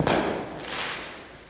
Sound effects > Natural elements and explosions
FRWKRec-Samsung Galaxy Smartphone, CU Fireworks, Pop, Whistle Nicholas Judy TDC
Fireworks pop and whistle.
fireworks, Phone-recording, pop, whistle